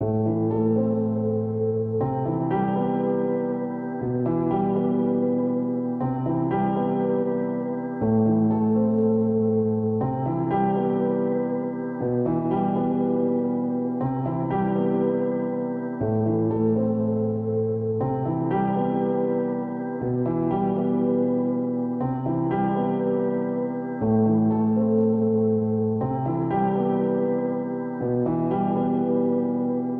Music > Solo instrument
Piano loops 029 efect 4 octave long loop 120 bpm
120, free, loop, music, piano, reverb, samples, simple